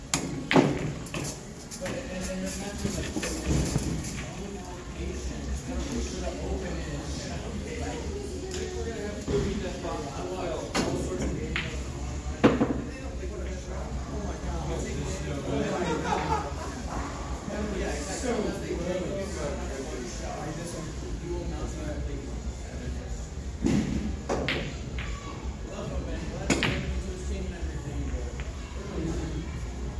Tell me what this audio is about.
Soundscapes > Indoors
AMBSprt-Samsung Galaxy Smartphone, MCU Billiards Ambience, Break Shots, Teenage Boys Walla Nicholas Judy TDC
Billiards ambience with break shots and teenage boys walla.
teenage, ambience, boys, walla, break-shot, billiards